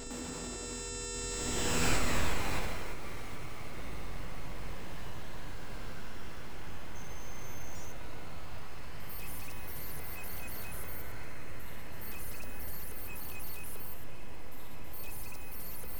Soundscapes > Synthetic / Artificial
Grain Space 10
noise, samples, free, soundscapes, experimental, electronic, granulator, sound-effects, glitch, sample, packs, sfx